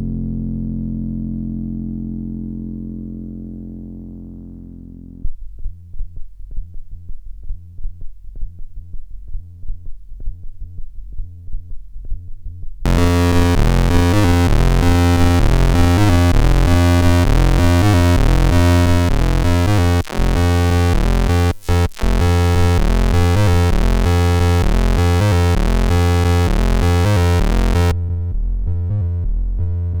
Music > Solo instrument
Uno Synth Preset 51-100 - 130 bpm loops
130, bass, bpm, house, ik, lead, loops, synth, techno, uno